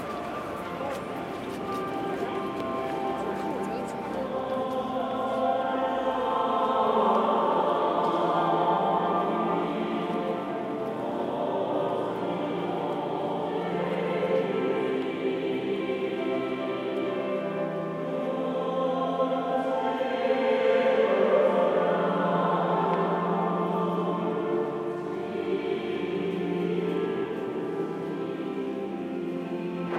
Music > Other
17/07/2025 - Basilica di Santa Trinita, Florence Heard a choir concert when I was wandering through streets of Florence Zoom H2N